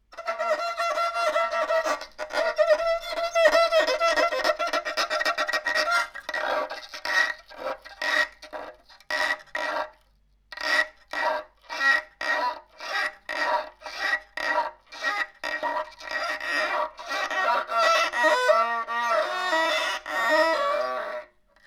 Instrument samples > String
Bowing broken violin string 24
creepy, horror, violin